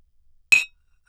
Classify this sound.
Sound effects > Objects / House appliances